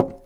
Music > Solo instrument
acoustic guitar tap 4
solo, instrument, slap, guitar, acosutic, dissonant, riff, twang, chord, string, chords, pretty, strings, knock